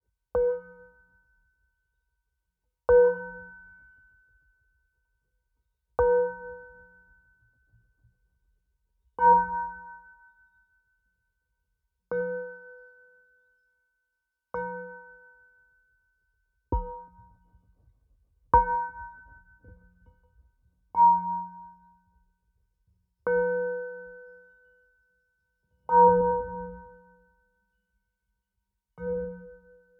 Sound effects > Other
Light impact with metal on metal. Recorded with a contact mic.
contact, dull, impact, metal, mic